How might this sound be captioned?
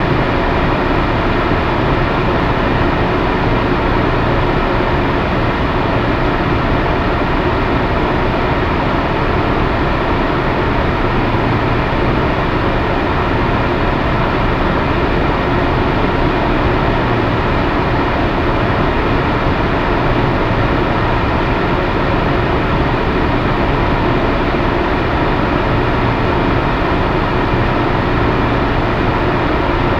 Sound effects > Objects / House appliances
Air purifier humming noise
Recording of an air purifier on the highest setting.
drone, electrical